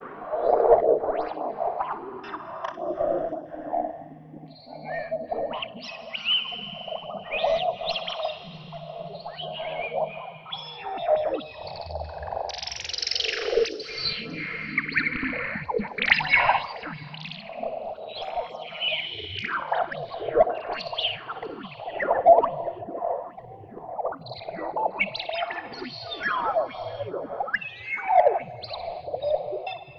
Soundscapes > Synthetic / Artificial
Late night jam with NI Massive running it through out of phase bandpass lfos and stacking up macros and routing, lots of feedback, formant shifting and FM, suddenly everything sounded like Magpies warbling so i took a quick recording and made some cuts Enjoy